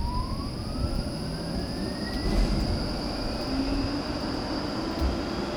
Sound effects > Vehicles
A tram leaving in Tampere, Finland. Recorded with OnePlus Nord 4.
tram, transportation, vehicle